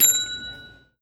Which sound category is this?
Sound effects > Objects / House appliances